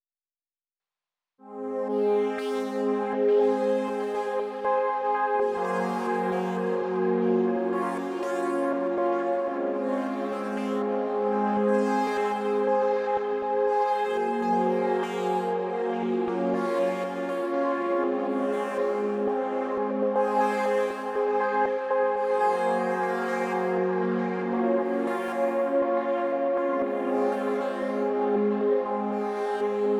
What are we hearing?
Music > Solo instrument
Smooth waves of synth sounds ringing out into the gridlines of a purple vaporwave night. Made with my MIDI controller.
loop, ambient, electronic, atmosphere, synth, music